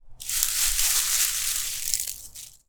Sound effects > Natural elements and explosions
Leaves Crunching Recording
Leaves rustling/crunching
Crunching, Rustling, Leaves